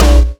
Instrument samples > Percussion
This soundfile is one of my few mainsnares. VERY GOOD!!! REMIND ME TO USE IT!!!
drum-loop, mainsnare, percussion, DW, death-metal, beat, corpsegrind, snare, extremophile, gore, distortion, death, side, metal, side-drum, 6x13-inch, distorted, drums, rhythm, leadsnare, drum, antipersonocracy, sample, drum-kit, groovy, snare-drum, logicogony, kingsnare, dominant-snare, 6x13